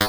Sound effects > Electronic / Design
RGS-Glitch One Shot 20
Effect, FX, Glitch, Noise, One-shot